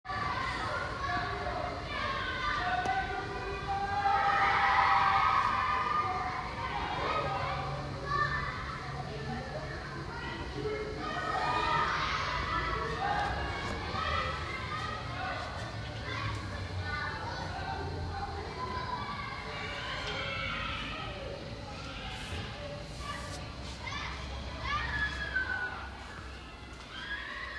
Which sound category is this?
Soundscapes > Urban